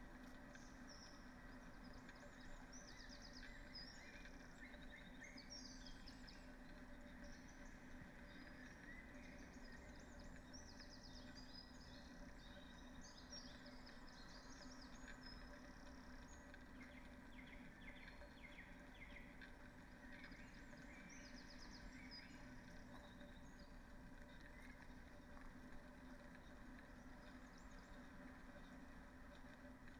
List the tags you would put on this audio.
Soundscapes > Nature
Dendrophone alice-holt-forest nature weather-data modified-soundscape sound-installation data-to-sound raspberry-pi artistic-intervention natural-soundscape soundscape field-recording phenological-recording

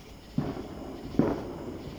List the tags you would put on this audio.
Sound effects > Other
sample-packs free-samples sfx america explosions independence patriotic day electronic United-States fireworks fireworks-samples experimental samples

